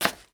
Sound effects > Other
Quick vegetable chop 7
Home, Chop, Cooking, Kitchen, Vegetable, Chief, Quick, Cook, Slice, Chef